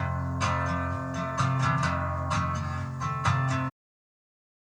Music > Solo instrument
acoustic rock loop
acosutic, chord, chords, dissonant, guitar, instrument, knock, pretty, riff, slap, solo, string, strings, twang